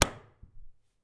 Sound effects > Objects / House appliances
Click of the Amplifier Switch to Turn it On
This is the sound of a guitar amplifier switch when you turn it on. The amplifier is the Polytone, a famous jazz amp for guitar. Recorded with Tascam Portacapture X6
amplifier, button, click, polytone, short, switch, turn-on